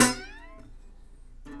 Music > Solo instrument
acoustic guitar slide17
chord, dissonant, pretty, riff, string